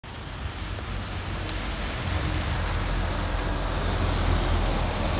Soundscapes > Urban
A bus passing the recorder in a roundabout. The sound of the bus engine and sound of rain can be heard in the recording. Recorded on a Samsung Galaxy A54 5G. The recording was made during a windy and rainy afternoon in Tampere.
bus, driving, rain